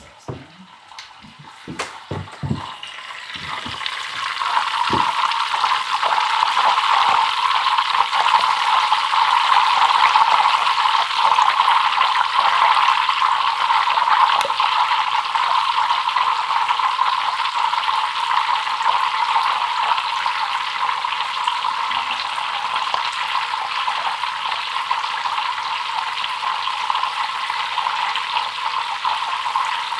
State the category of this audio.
Sound effects > Natural elements and explosions